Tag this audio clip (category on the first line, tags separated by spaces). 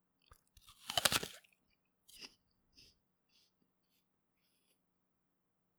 Sound effects > Human sounds and actions
apple bite chew eat